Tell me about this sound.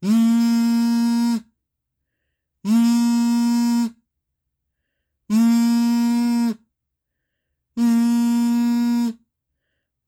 Sound effects > Objects / House appliances
COMCell-Samsung Galaxy Smartphone, CU Phone, Vibrating, Kazoo Imitation, Sounds Real, Cartoon Nicholas Judy TDC
A kazoo imitating a cell phone vibrating. Sounds real. Cartoon.